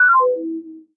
Sound effects > Electronic / Design
Sine Alright
A sweet lil ringtone/chime, made in Ableton, processed in Pro Tools. I made this pack one afternoon, using a single sample of a Sine wave from Ableton's Operator, stretching out the waveform, and modulating the pitch transposition envelope.